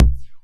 Instrument samples > Synths / Electronic
606BD OneShot 01
606, Analog, Bass, BassDrum, Drum, DrumMachine, Electronic, Kit, Mod, Modified, music, Synth, Vintage